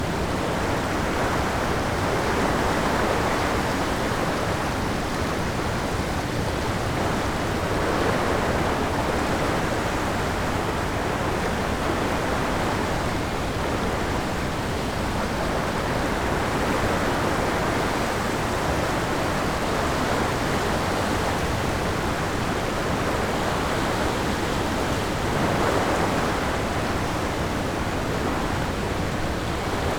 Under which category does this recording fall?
Soundscapes > Urban